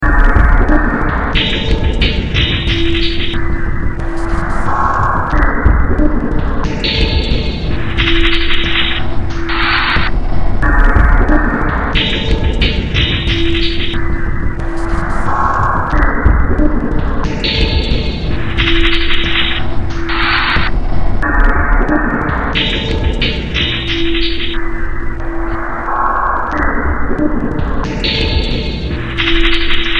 Music > Multiple instruments
Demo Track #3587 (Industraumatic)
Soundtrack, Noise, Horror, Games, Sci-fi, Cyberpunk, Underground, Industrial, Ambient